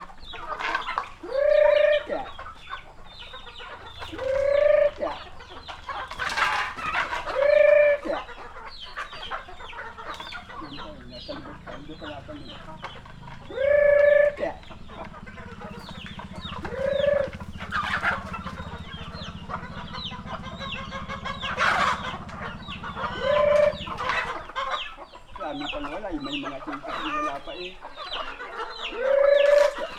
Soundscapes > Other
250718 165525 PH Filipino man feeding chickens
Filipino man (70 years old) feeding chickens outdoor. Here’s my father in law feeding his beloved roosters, chickens and chicks, appealing them with his so special voice call. Great thanks to him for letting me record this moment ! ;-) Recorded in the surroundings of Santa Rosa (Baco, Oriental Mindoro, Philippines)during July 2025, with a Zoom H5studio (built-in XY microphones). Fade in/out applied in Audacity.
ambience, atmosphere, chick, chicken, chickens, chicks, countryside, farm, farmer, feed, feeding, field-recording, Filipino, man, motorcycle, outdoor, Philippines, poultry, rooster, roosters, Santa-Rosa, soundscape, Tagalog, voice